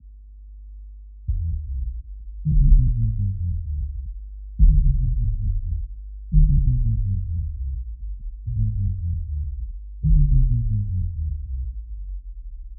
Sound effects > Experimental
Fan To RCA EQ
Electric fan motor hooked up to RCA to create bass sound
diy, techno, sample, recorded